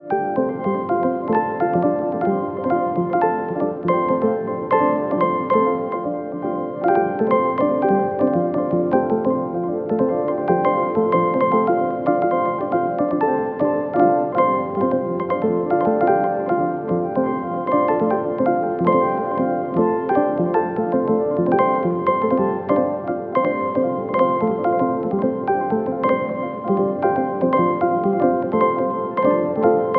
Soundscapes > Synthetic / Artificial
Botanica-Granular Ambient 11
Botanica, Granular, Atomosphere, Ambient, Beautiful